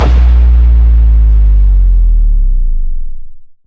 Sound effects > Electronic / Design
RESYNTH IMPACT THREE X
A custom cinematic impact using a triangle waveform for added LFE value, engineered from pure synthesis, resynthesis, and standard sound design techniques. Designed for high-intensity transitions, trailers, and sound design projects.
impact-sound, trailer-fx, explosion, boomer, cinematic, lfe, filmscore, impact, sound-design, cinematic-impact, synthetic-hit, hit, film